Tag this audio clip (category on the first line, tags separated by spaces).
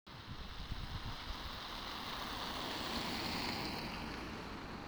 Sound effects > Vehicles
vehicle automobile car